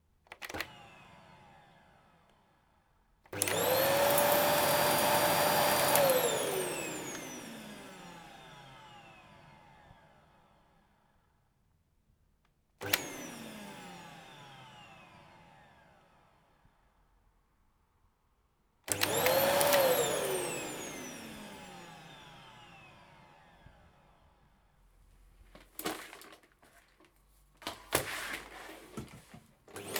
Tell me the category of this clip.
Sound effects > Objects / House appliances